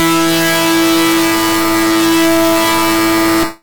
Instrument samples > Synths / Electronic
a synth i created with edited presets in fl studio